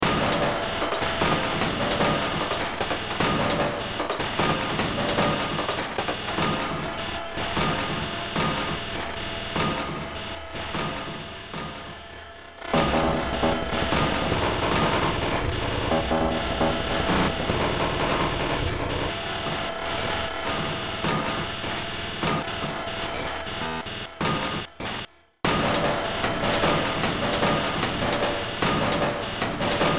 Music > Multiple instruments
Short Track #2964 (Industraumatic)
Ambient, Cyberpunk, Games, Horror, Industrial, Noise, Sci-fi, Soundtrack, Underground